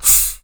Sound effects > Objects / House appliances
AIRHiss-Blue Snowball Microphone, CU Soda Bottle Open, Element Only Nicholas Judy TDC
A soda bottle opening. Air hiss element only.
Blue-brand, Blue-Snowball, bottle, element, open, soda